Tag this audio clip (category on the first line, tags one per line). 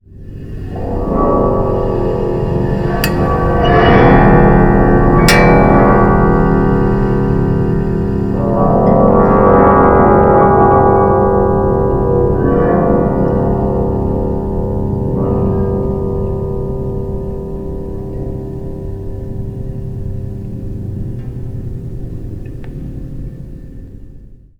Soundscapes > Other
dischordant
storm
strings
swells
wind